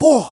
Speech > Solo speech
Random Brazil Funk Volcal Oneshot 5
Hey, cool! can't believe I can say ''po'' like a old man! I just tightened my throat. Recorded with my Headphone's Microphone, I was speaking randomly, I even don't know that what did I say，and I just did some pitching and slicing works with my voice. Processed with ZL EQ, ERA 6 De-Esser Pro, Waveshaper, Fruity Limiter.
Acapella, BrazilFunk, EDM, Oneshot, Volcal